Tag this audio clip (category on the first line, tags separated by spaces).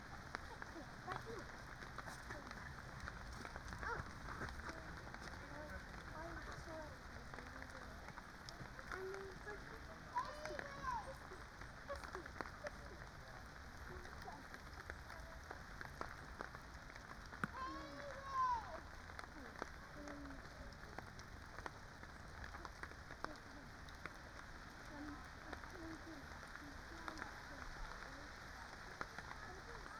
Soundscapes > Nature
natural-soundscape modified-soundscape field-recording artistic-intervention weather-data alice-holt-forest phenological-recording sound-installation raspberry-pi soundscape data-to-sound nature Dendrophone